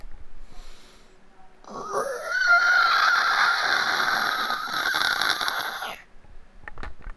Sound effects > Other
Walking outside on rocks
Outdoors, Footsteps, Walking